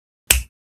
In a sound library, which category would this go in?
Sound effects > Human sounds and actions